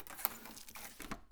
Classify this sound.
Sound effects > Other mechanisms, engines, machines